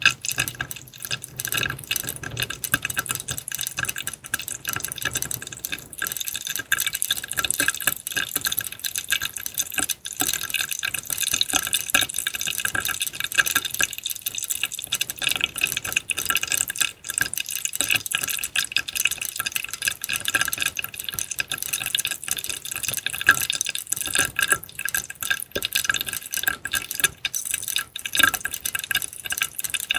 Sound effects > Natural elements and explosions
Sodacan fizz (EDITED)
Subject : A tall 33cl soda can, recorded pointing slightly down to the opening. An edited version with a fair bit of limiters and a denoise to make it more poppy. (Too much) Date YMD : 2025 July 23 In the Early morning. Location : France indoors. Sennheiser MKE600 P48, no filter. Weather : Processing : Trimmed and normalised in Audacity, limiters and a denoise to make it more poppy.